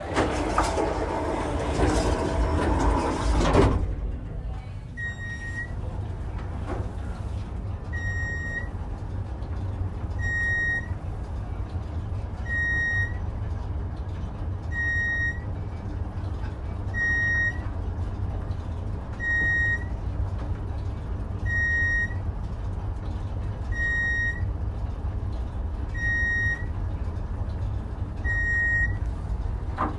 Sound effects > Other mechanisms, engines, machines
Elevator doors closing and then riding up several floors, with electronic beeps at each floor. People's voices can be heard outside upon arrival and doors opening. Recorded at Price Tower in Bartlesville, OK, architect Frank Lloyd Wright's only skyscraper ever constructed.